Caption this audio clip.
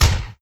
Sound effects > Natural elements and explosions
Explosion 4 (Burning Car rec by Ñado)
Explosion from a burning car.
bang, boom, car, destroy, destruction, explode, exploding, explosion, fire, flame, flames, ka-pow, loud, night, realistic, vehicle